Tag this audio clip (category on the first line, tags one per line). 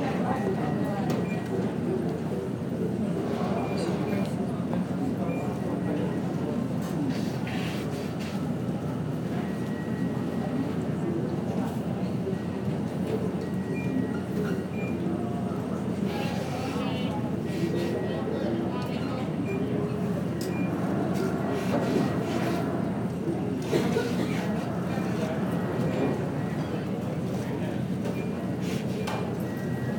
Soundscapes > Indoors
ambience
people
airport
gate